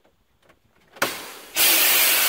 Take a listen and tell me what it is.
Sound effects > Other mechanisms, engines, machines
air brake sound effect
Air brake on bus